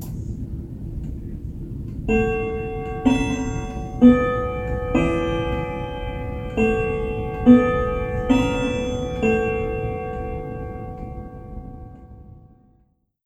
Sound effects > Other mechanisms, engines, machines
A grandfather clock's half hour chime.